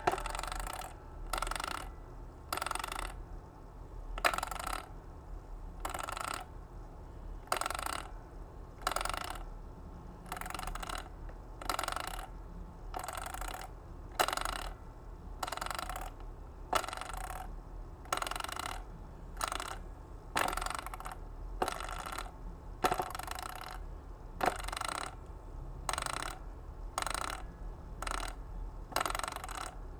Sound effects > Objects / House appliances
TOONTwang-Blue Snowball Microphone, MCU Springy Nicholas Judy TDC
Blue-brand, Blue-Snowball, spring, springy, twang